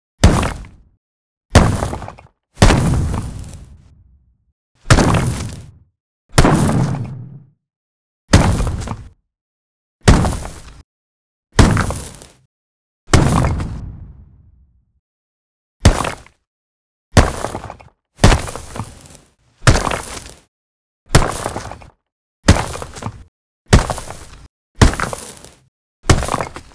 Sound effects > Objects / House appliances
bassy small fate stay night impact jump or land sound 11212025
Custom superhero or anime inspired fate stay night UBW bassy jump or landing sound with rocks and debris splay.
boot, attack, ground, super, superspeed, rock, small, impact, footstep, jump, anime, stones, fate, footsteps, land, boost, bassy, step, staynight, superhero, landing, jumping, power, speedster, speed, stomp, foot, debris, battle